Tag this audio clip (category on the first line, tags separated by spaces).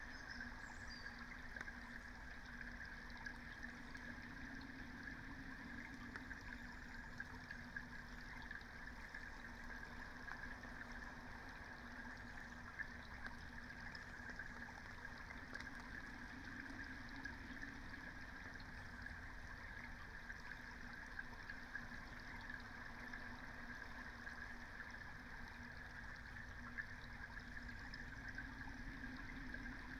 Soundscapes > Nature
alice-holt-forest data-to-sound Dendrophone natural-soundscape raspberry-pi soundscape weather-data